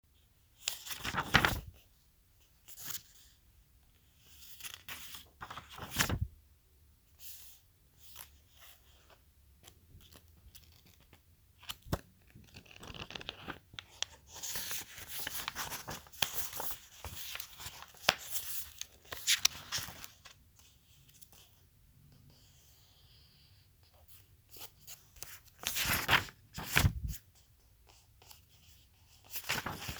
Sound effects > Objects / House appliances
Book page flipping 01
book, flip, magazine, page, paper, read, reading, sheet, turn, turn-page